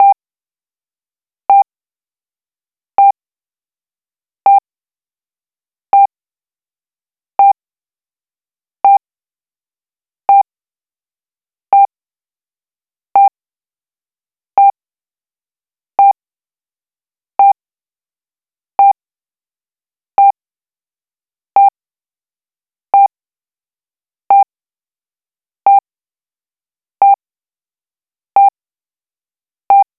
Sound effects > Electronic / Design
Patient monitor- 795hz - 40bpm to 540 constant
Trim the tempo you like for your project. 540bpm is a bit high for a heart rate ... but maybe you want that for artistic purposes :) Add reverb at will. It can probably be used as a sonar / radar blip too with the right reverb.
oneshot, radar